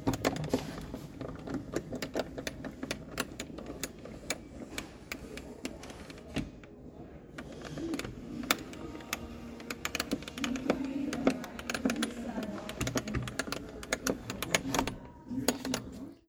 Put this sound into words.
Sound effects > Objects / House appliances
WNDWHdwr-Samsung Galaxy Smartphone, MCU Window with Compliant Handle, Crank Open, Close Nicholas Judy TDC
A window with compliant handle cranking open and closed. Recorded at The Home Depot.
close, compliant, crank, foley, handle, open, Phone-recording, window